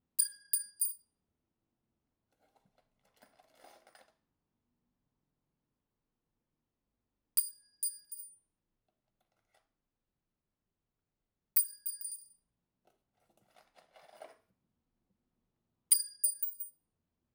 Sound effects > Objects / House appliances
Nail falling on the floor Recorded with zoom H2n, edited with RX
foley, nail